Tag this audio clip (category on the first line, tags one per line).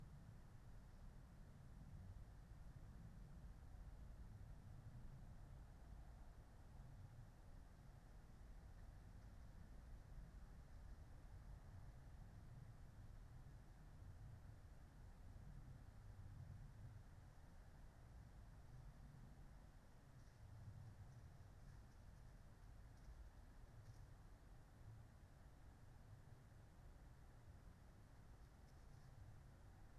Soundscapes > Nature
soundscape
artistic-intervention
natural-soundscape
sound-installation
Dendrophone
modified-soundscape
phenological-recording
nature
data-to-sound
weather-data
raspberry-pi
field-recording
alice-holt-forest